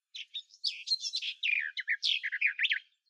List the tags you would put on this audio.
Sound effects > Animals
Bird
birdsong
field
Garden
nature
recording
UK
warbler

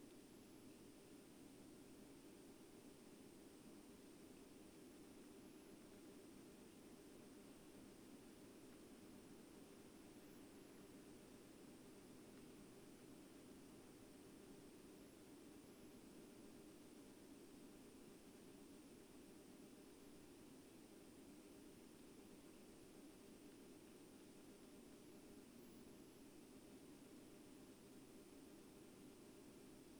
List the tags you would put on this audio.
Soundscapes > Nature
raspberry-pi
soundscape
modified-soundscape

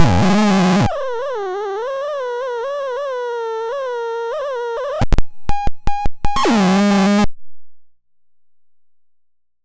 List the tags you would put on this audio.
Sound effects > Electronic / Design
Theremins
Electronic
Spacey
Experimental
Robotic
Bass
Otherworldly
FX
Digital
Scifi
Theremin
Alien
Infiltrator
noisey
SFX
Robot
Instrument
Noise
Glitch
Sci-fi
Analog
Sweep
Synth
Dub
Electro
Glitchy
Handmadeelectronic
DIY
Optical
Trippy

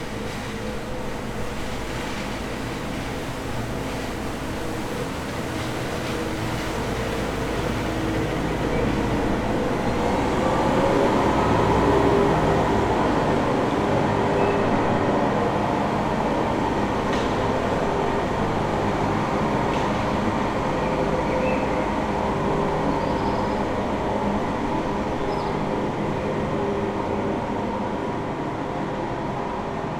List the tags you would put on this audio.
Sound effects > Vehicles
Tarn
Wind-cover
handheld
sweeper
Rode
Early
FR-AV2
vehicle
Tascam
Early-morning
City
Single-mic-mono
Saturday
NT5

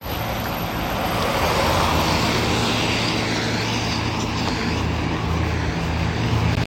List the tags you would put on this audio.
Sound effects > Vehicles
tire
road

